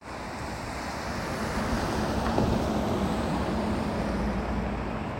Soundscapes > Urban
Bus driving by recorded on an iPhone in an urban area.
bus, transportation, vehicle